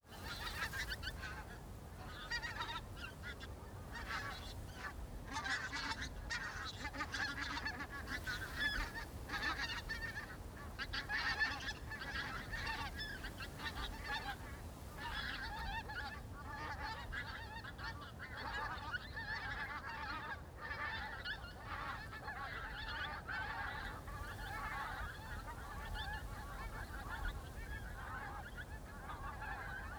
Soundscapes > Nature
Pink Footed Geese over head
Pink footed geese at dusk roosting at Aberlady Bay. End of Storm Amy. Line Audio CM4s, ORTF.
beach, birds, field-recording, geese, nature, wind